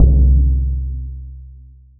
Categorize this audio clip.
Instrument samples > Percussion